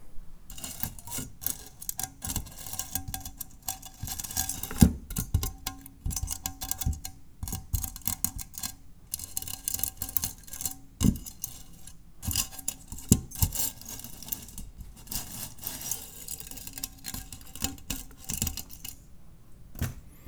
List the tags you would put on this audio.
Sound effects > Other mechanisms, engines, machines
Bristle Brush Brushing Foley fx Household Mechanical Metallic Scrape sfx Shop Tool Tools Woodshop Workshop

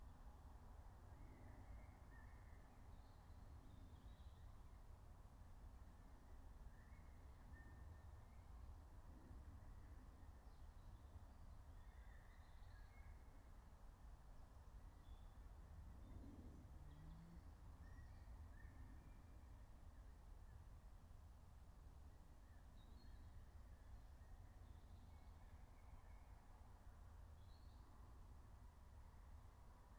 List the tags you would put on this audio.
Soundscapes > Nature
alice-holt-forest field-recording meadow natural-soundscape nature phenological-recording raspberry-pi soundscape

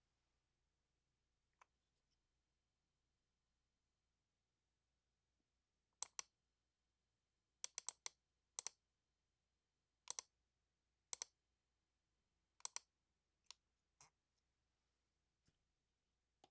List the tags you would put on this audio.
Sound effects > Other
clicking searching mouse internet

